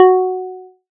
Instrument samples > Synths / Electronic
APLUCK 1 Gb
pluck, fm-synthesis